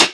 Instrument samples > Percussion
It's a bass hi-hat based on a re-enveloped crash file. closed-hi-hatized namesake crash (search my crash folder) I drew the waveform's envelope on WaveLab 11. tags: hi-hat minicymbal picocymbal click metal metallic tick bronze brass cymbal-pedal drum drums percussion hat hat-cymbal closed-hat closed-cymbals chick-cymbals hat-set snappy-hats facing-cymbals dark crisp dark-crisp Zildjian Sabian Meinl Paiste Istanbul Bosporus